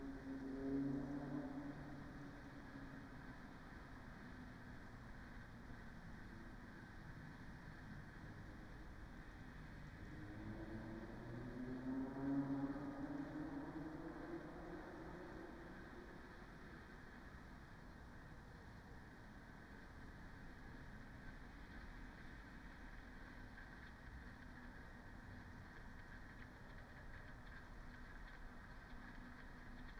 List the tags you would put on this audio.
Soundscapes > Nature
soundscape
modified-soundscape
natural-soundscape
alice-holt-forest
sound-installation
raspberry-pi
Dendrophone
nature
data-to-sound
weather-data
field-recording
artistic-intervention
phenological-recording

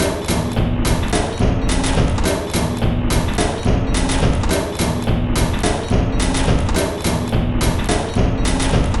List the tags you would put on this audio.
Instrument samples > Percussion
Ambient
Underground
Weird
Drum
Samples
Loop
Packs
Alien
Industrial
Loopable
Dark
Soundtrack

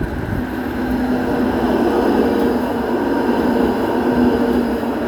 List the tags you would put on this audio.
Sound effects > Vehicles
embedded-track
moderate-speed
passing-by
Tampere
tram

